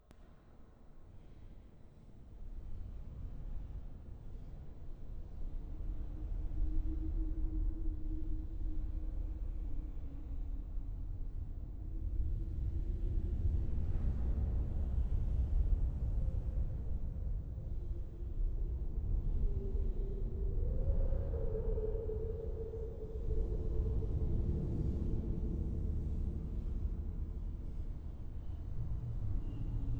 Soundscapes > Other
Dark ambient 2
Here's another sound I made by blowing on the mic, whistling, and rubbing cloth on the mic. It sounds like it could be from a cave or in a sewer. You can use this for what ever you want. Tell me if you use it for something.